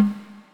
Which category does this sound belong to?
Music > Solo percussion